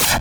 Sound effects > Other
Small SciFi laser sound. Created with LMMS and Audacity.